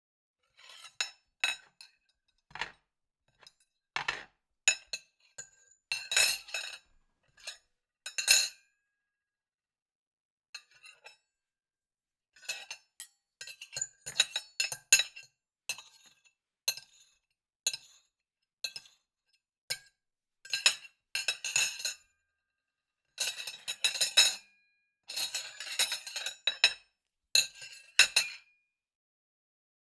Sound effects > Objects / House appliances
Using metal cutlery on a ceramic dinner plate.
clank, clink, cutlery, draw, fork, hit, kitchen, knife, metal, metallic, percussion, sfx, spoon, steel